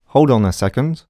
Speech > Solo speech
Confused - Hold on a second
Neumann, Voice-acting, talk, Single-take, Man, confused, Vocal, Video-game, Human, words, dialogue, oneshot, NPC, voice, Male, Mid-20s, sentence